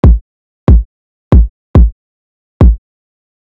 Music > Solo percussion
Punchy Kick Pattern - 140bpm
A Kick loop at 140bpm
Kick, bpm, loop, UK, Drill, 140, Grime, Trap, pattern